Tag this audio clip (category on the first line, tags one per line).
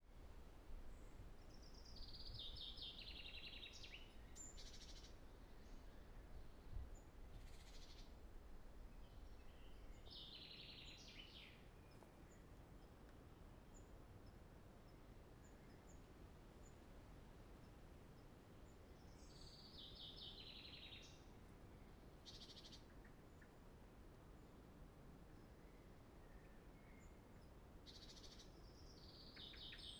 Soundscapes > Nature
Birds
Field-Recording
Forest
Nature